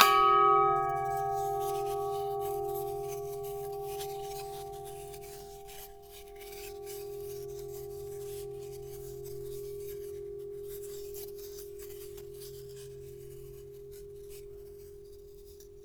Sound effects > Other mechanisms, engines, machines
Woodshop Foley-020
sound, knock, oneshot, tools, pop, thud, rustle, perc, crackle, bop, metal, fx, strike, tink, shop, wood, percussion, little, bam, bang, boom, foley, sfx